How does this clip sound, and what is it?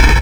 Instrument samples > Percussion
tags: aliendrum aliendrums alienware exoalien non-Mexican-alien UFOs-don't-speak-Spanish snare fake whoosh rush blast breeze burst dart dash flap flash fly flutter gale gasp gust hurry roar shoot sigh sough sprint swish whiz zoom aliensnare fakecrash junk spaceship spaceshit fakery junkware weird uncanny eerie unnatural preternatural supernatural unearthly other-worldly unreal ghostly mysterious mystifying strange abnormal unusual eldritch creepy spooky freaky rum odd bizarre peculiar quirky surreal atypical unorthodox unconventional extraordinary uncommon outlandish